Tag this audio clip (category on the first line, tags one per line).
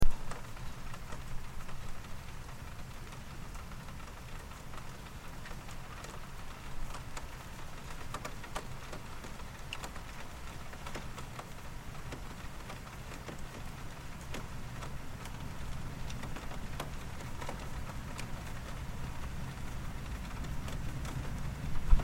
Sound effects > Other
raindrop
raindrops
raining